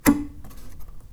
Sound effects > Other mechanisms, engines, machines
Woodshop Foley-042
bam, bang, boom, bop, crackle, foley, fx, knock, little, metal, oneshot, perc, percussion, pop, rustle, sfx, shop, sound, strike, thud, tink, tools, wood